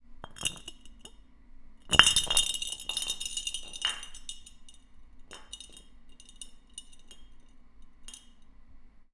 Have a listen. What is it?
Sound effects > Objects / House appliances
Glass bottle rolling 4
A glass bottle rolling on a concrete floor (in the recycling room). Recorded with a Zoom H1.